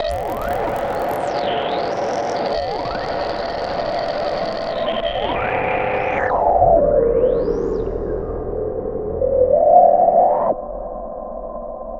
Sound effects > Electronic / Design
Roil Down The Drain 2
PPG-Wave, science-fiction, dark-techno, noise-ambient, vst, mystery, noise, sci-fi, scifi, content-creator, dark-soundscapes, cinematic, dark-design, sound-design, drowning, horror